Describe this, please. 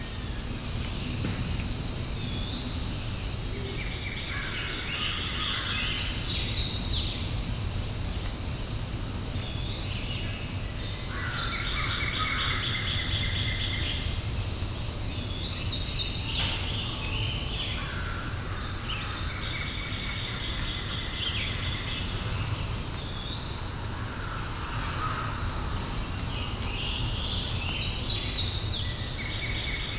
Soundscapes > Nature
AMBForst-Summer early morning woods, birds, crickets, snapping twigs, 630AM QCF Mammoth Cave KY Zoom H2n Surround Binaural Mixdown
Early Summer Morning in woods near cabins at Mammoth Cave National Park. Birds, insects.
morning, crickets, field-recording, woods, birds, summer